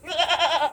Sound effects > Animals
Goats - Goat Bleat; Close Perspective, Take 3
A goat's bleat which an LG Stylus 2022 recorded.